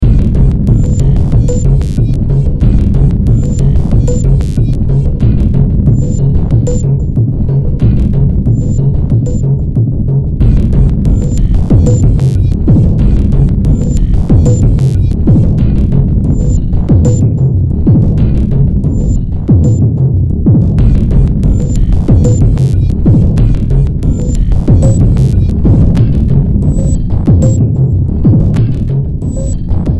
Music > Multiple instruments
Demo Track #2987 (Industraumatic)
Underground, Ambient, Soundtrack, Games, Horror, Industrial, Cyberpunk, Sci-fi, Noise